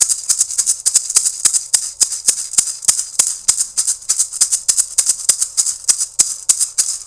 Music > Solo percussion
Salt shaker simulated using a maraca.